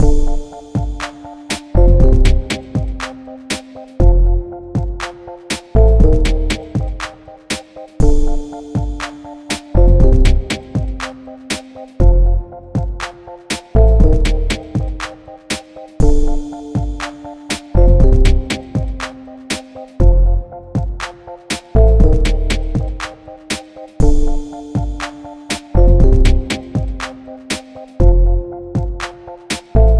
Music > Multiple instruments
Waiting Room Beat 120Bpm
Simple loop. 20bpm. This was not made with AI. This was made using Ableton live, with MIDI instruments, triggered using a keyboard.
120bpm, Beat, Loop